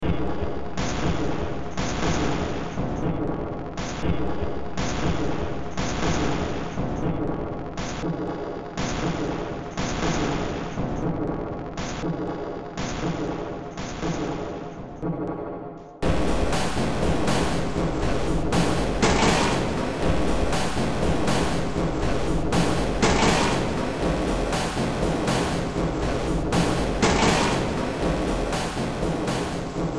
Music > Multiple instruments
Demo Track #3571 (Industraumatic)
Noise, Ambient, Industrial, Horror, Games, Sci-fi, Soundtrack, Underground, Cyberpunk